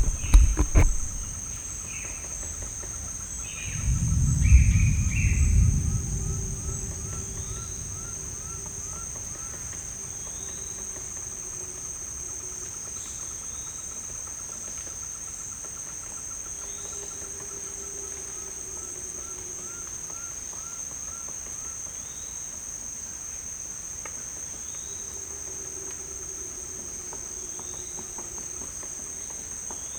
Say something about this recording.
Soundscapes > Nature
Panamanian jungle in the rain howlers and birds
Howler monkey roars echo off a canyon as thunder booms from an approaching rainstorm.
rain, rainforest, monkey